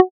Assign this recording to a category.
Instrument samples > Synths / Electronic